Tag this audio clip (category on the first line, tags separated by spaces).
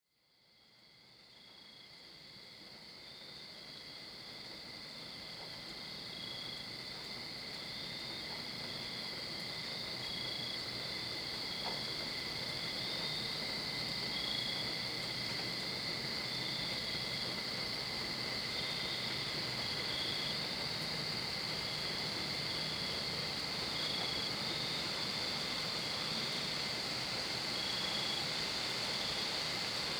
Soundscapes > Nature
birds crickets field-recording nature rain thunder wind